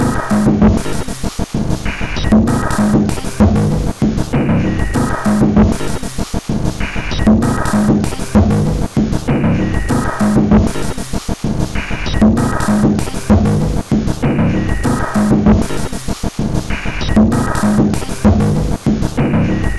Instrument samples > Percussion
This 97bpm Drum Loop is good for composing Industrial/Electronic/Ambient songs or using as soundtrack to a sci-fi/suspense/horror indie game or short film.
Industrial, Samples, Loopable, Alien, Packs, Dark, Ambient